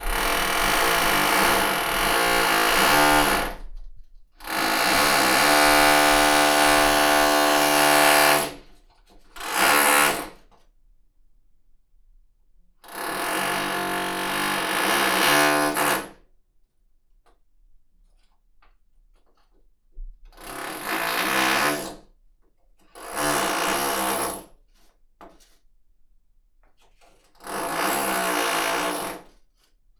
Soundscapes > Indoors
Drilling holes in the wall No. 1
I wanted to relax after work, but my upstairs neighbor decided it was the perfect time to drill holes in the wall... I made the best of it and recorded the sound instead. Recorded with a Zoom H1n and Movo X1-Mini.
indoor, neighbor, drilling, H1n, Zoom, noise, wall, MovoX1mini